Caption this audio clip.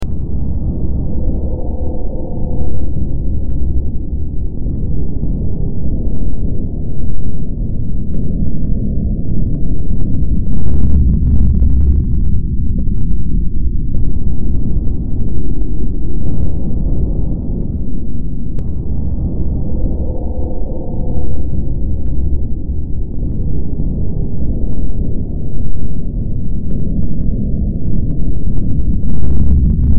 Music > Multiple instruments
Demo Track #4016 (Industraumatic)
Industrial, Sci-fi, Soundtrack, Cyberpunk, Underground, Horror, Ambient, Noise, Games